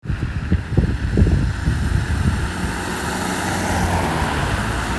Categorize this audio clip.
Sound effects > Vehicles